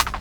Instrument samples > Synths / Electronic
CR5000-rimshot 01
CompuRhythm, Drums, Vintage, CR5000, Drum, Analog, Electronic, AnalogDrum, Synth, Loop, DrumMachine, Roland, Beat, 80s